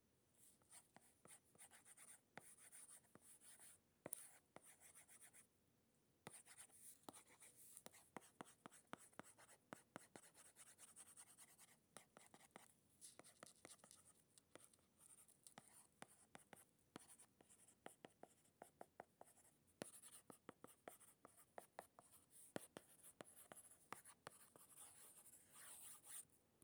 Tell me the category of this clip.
Sound effects > Human sounds and actions